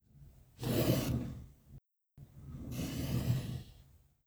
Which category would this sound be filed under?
Sound effects > Objects / House appliances